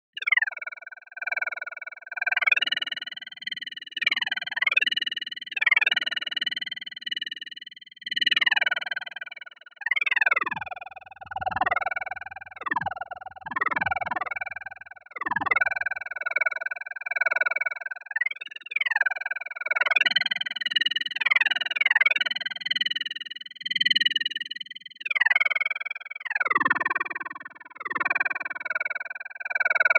Sound effects > Electronic / Design
mystic creature DRY
Weird monster sounds i created in DAW; you can use your imagination to where this "animal" could live and use it for horror stuff or maybe combine it with some ambients This is DRY version without Reverb.